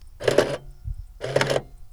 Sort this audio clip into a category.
Sound effects > Vehicles